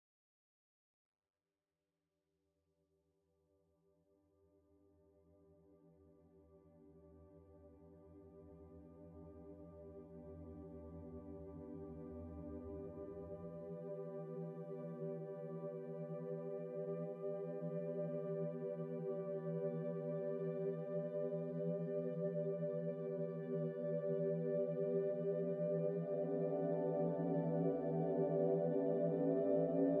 Soundscapes > Synthetic / Artificial
Complex shifting ambient drone 3
An ambient soundscape with melodic elements derived from two minutes of interlocked modular synth sequences and arpeggios, timestretched and processed. Use as is or sample/edit/rework as you wish.
accompaniment, ambient, melodic, mysterious, peaceful, soundscape, synth